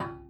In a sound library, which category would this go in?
Sound effects > Objects / House appliances